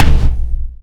Instrument samples > Percussion
A GREAT main basstom/floortom 1. It makes an unnecessary noise after the attack though.